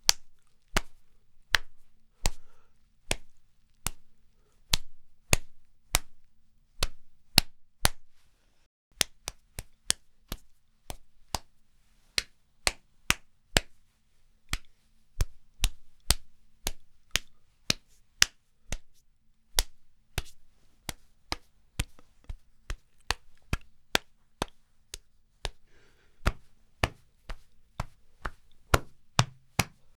Sound effects > Human sounds and actions
Real hand slaps palm hit body arm touch Humdrum
Natural sound of an arm being touched.